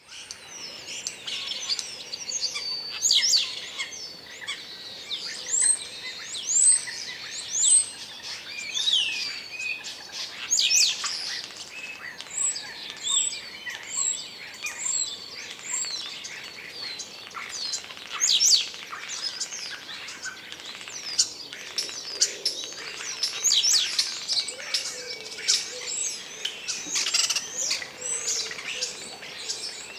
Soundscapes > Nature
Forest atmosphere 011(localization Poland)
birdsong, natural, soundscape, forest, rural, wild, atmosphere, outdoor, Poland, peaceful, nature, environmental, calm, birds, field-recording, ambience, ambient, background, European-forest